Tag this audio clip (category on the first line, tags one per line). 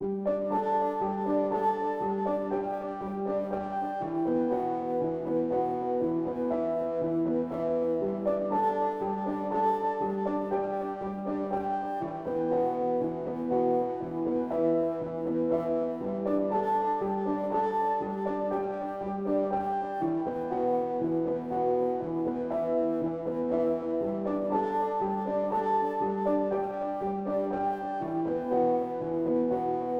Music > Solo instrument
120
free
loop
music
piano
pianomusic
reverb
samples
simple
simplesamples